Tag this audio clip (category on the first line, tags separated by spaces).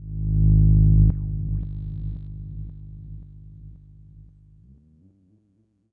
Sound effects > Experimental
oneshot fx robot electro robotic trippy sci-fi alien synth retro weird analog pad basses analogue vintage mechanical electronic machine sample complex bassy korg sweep dark bass effect sfx